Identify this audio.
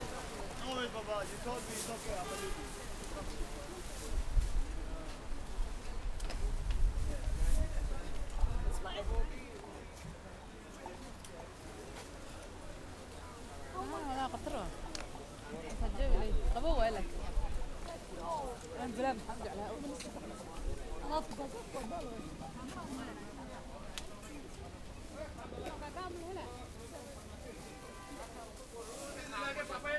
Soundscapes > Urban
LNDN SOUNDS 035
bustling, london, market, neighbourhood